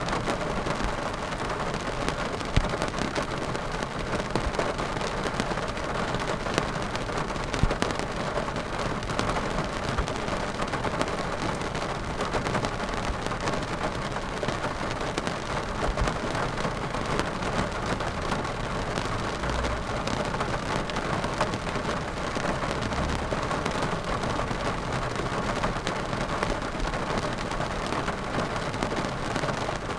Soundscapes > Nature
Light Autumn Rain on the Car Windscreen Front Glass #002
The sound of the rain on the windscreen front glass of my car
nature, raining, water, weather, glass, field-recording, window, car, autumn, rain, windshield, windscreen